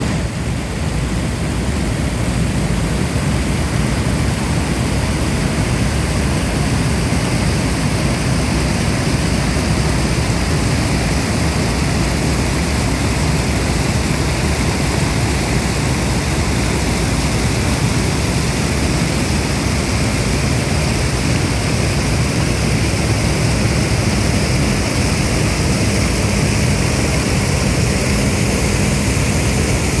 Soundscapes > Nature
Waterfall Cheddar Gorge
The sound of a waterfall running in Cheddar Gorge, Somerset, UK h20 water
cheddar, gorge, hydrogen, water, waterfall